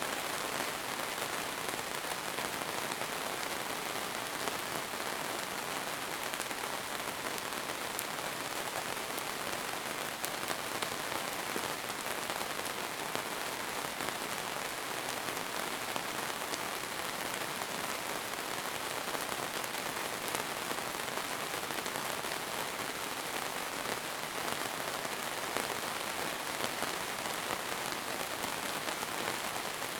Soundscapes > Nature
24h ambiance pt-05 - 2025 04 16 06H00 - 07h38 Gergueil Greenhouse
Subject : One part out of ten of a 24h MS recording of Gergueil country side. Recorded inside a Greenhouse. Date YMD : Project starting at 20h20 on the 2025 04 15, finishing at 20h37 on the 2025 04 16. Location : Gergueil 21410, Côte-d'Or, Bourgogne-Franche-Comté. Hardware : Zoom H2n MS, Smallrig Magic-arm. At about 1m60 high. Weather : Rainy, mostly all night and day long. Processing : Trimmed added 5.1db in audacity, decoded MS by duplicating side channel and inverting the phase on right side. (No volume adjustment other than the global 5.1db).